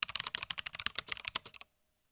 Sound effects > Other mechanisms, engines, machines
Very fast typing on a mechanical keyboard. Recorded using a Pyle PDMIC-78